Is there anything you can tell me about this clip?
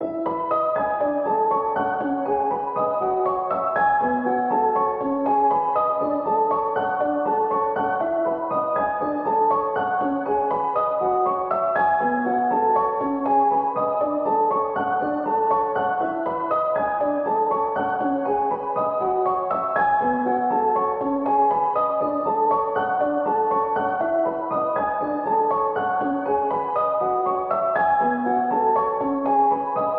Music > Solo instrument
Piano loops 112 efect 4 octave long loop 120 bpm
120, 120bpm, free, loop, music, piano, pianomusic, reverb, samples, simple, simplesamples